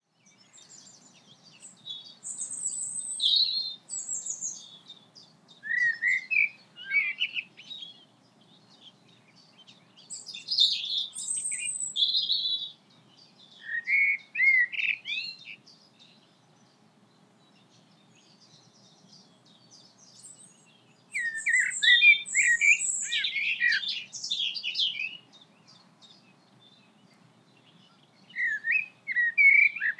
Soundscapes > Nature
An recording from RSPB Langford Lowfields. Edited using RX11.
birds, ambience, field-recording, outdoors, nature, rspb